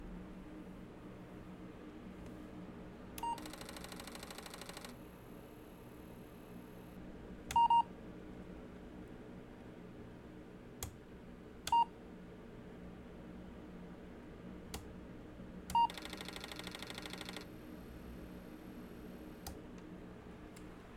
Sound effects > Electronic / Design
Sound of an Apple IIc being fliped on and off